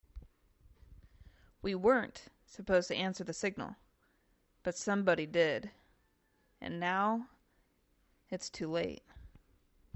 Speech > Solo speech
“The Signal” (sci-fi / alien contact / apocalyptic)
A sci-fi warning filled with tension, setting up a story about forbidden transmissions or catastrophic contact.
alien, Script, sci-fi